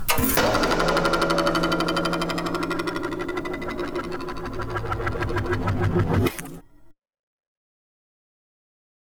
Sound effects > Other mechanisms, engines, machines
Strange Mechanical Whiplash
A strange mechanical vibration sound effect created from layered samples from my tool shop, processed in Reaper